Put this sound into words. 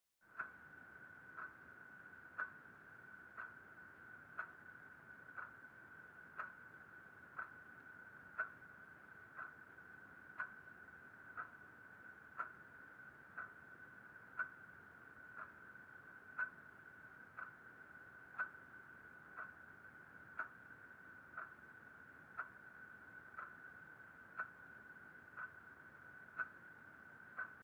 Sound effects > Experimental
clock wind
A ticking clock sound with an eerie sci-fi vibe (that was the intention at least)